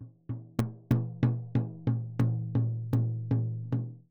Music > Solo percussion
Med-low Tom - Oneshot 43 12 inch Sonor Force 3007 Maple Rack
drum, drums, flam, kit, loop, maple, Medium-Tom, oneshot, percussion, quality, realdrum, recording, roll, Tom, tomdrum, toms, wood